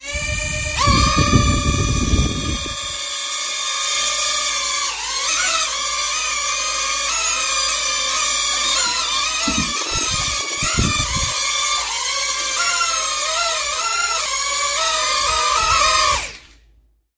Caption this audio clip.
Sound effects > Objects / House appliances
A drone starting, flying around and stopping. A bit of fuzzy wind noise.